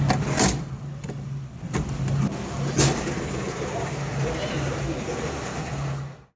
Sound effects > Other mechanisms, engines, machines
MECHLvr-Samsung Galaxy Smartphone, CU Arcade Game, Lever Nicholas Judy TDC
An arcade game lever.
arcade, foley, game, lever, Phone-recording